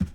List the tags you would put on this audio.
Sound effects > Objects / House appliances
carry clang garden lid pour spill tip

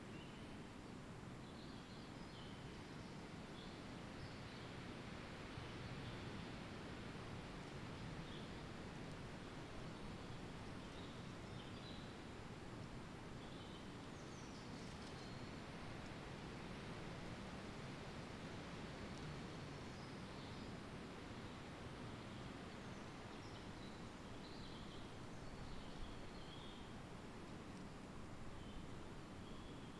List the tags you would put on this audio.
Soundscapes > Nature
raspberry-pi natural-soundscape nature field-recording

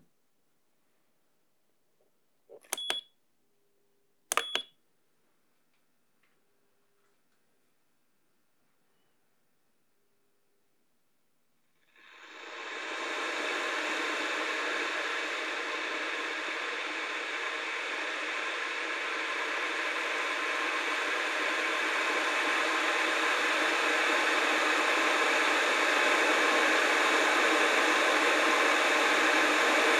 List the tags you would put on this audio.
Sound effects > Objects / House appliances
kettle sfx boil switch water bubble bubbling boiling

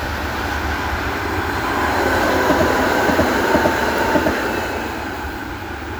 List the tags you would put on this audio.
Soundscapes > Urban
Drive-by field-recording Tram

